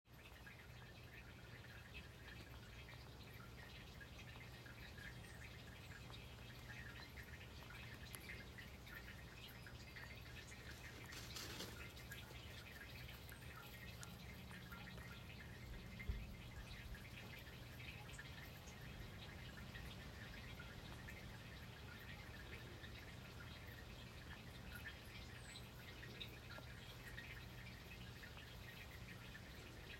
Soundscapes > Nature
Indigo extracting 10/27/2024
extracting, indigo, plants